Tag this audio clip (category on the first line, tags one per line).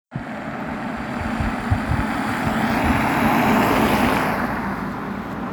Sound effects > Vehicles
studded-tires
asphalt-road
car
wet-road
moderate-speed
passing-by